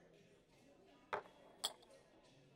Sound effects > Objects / House appliances
Shots on bar
Shot glasses put down on table and clink.
clink, glass, glasses, table